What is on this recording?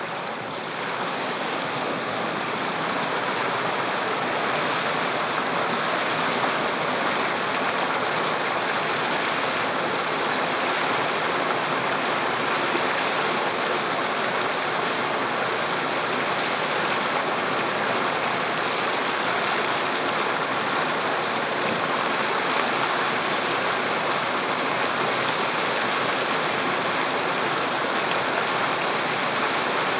Soundscapes > Nature
Field recording taken at a small, quiet mountain waterfall. The gentle sound of the water and, in the background, the sounds of nature. Recorded with Tascam DR-05